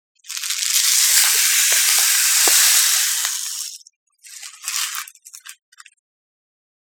Sound effects > Objects / House appliances
adding-oat-flakes-to-bowl
Dry oats falling into a bowl with a soft rustle. Recorded with Zoom H6 and SGH-6 Shotgun mic capsul
pour, oats, cereal, oatmeal, kitchen, bowl